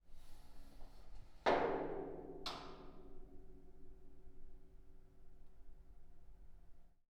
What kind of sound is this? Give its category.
Sound effects > Objects / House appliances